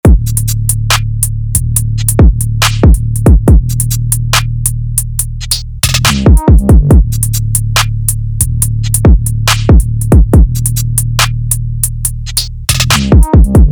Music > Solo percussion
older lil uk grime influenced beat loop of mine made in ableton with drum and bass sounds synthesized with serum. E flat minor. 140bpm.